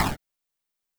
Sound effects > Other mechanisms, engines, machines
A spx effects , if the player collision on a object and get hurt.